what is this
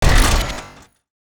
Sound effects > Other
Sound Design Elements Impact SFX PS 082
power, sound, explosion, game, sfx, strike, hit, crash, smash, collision, impact, blunt, audio, hard, shockwave, transient, cinematic, effects, percussive, rumble, force, heavy, design, sharp, thudbang